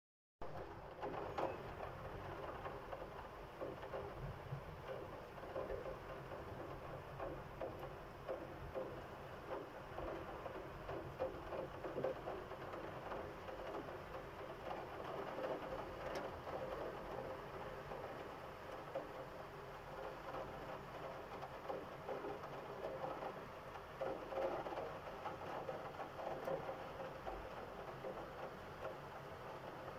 Soundscapes > Nature
Rain outside the window
the sound of rain and thunder outside the window #02:20 thunder
rain, raindrops, raining, thunder